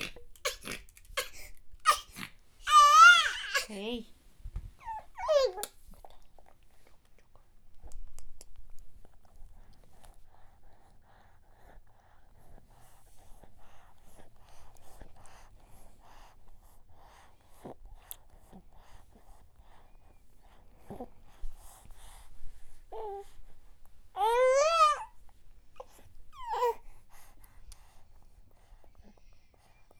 Speech > Other
Baby cry feeding
baby, crying, newbord, feeding